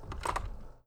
Sound effects > Objects / House appliances
COMTelph-Blue Snowball Microphone Nick Talk Blaster-Telephone, Receiver, Pick Up 06 Nicholas Judy TDC
A telephone receiver being picked up.
Blue-brand, Blue-Snowball, pick-up, telephone